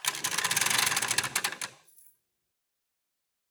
Sound effects > Other mechanisms, engines, machines
Pull Chain-04
Pull-chain on a loading door mechanism
loadingdoor, machinery